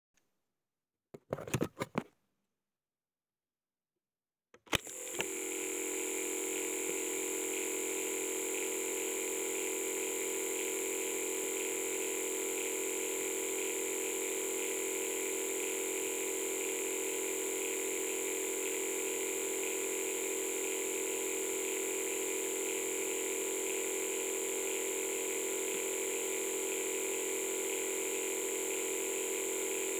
Sound effects > Objects / House appliances
small cassette tape recorder
This's my old small cassette recorder. It's a Panasonic RQA-200 ;)
fx, noice, tape, sound, cassette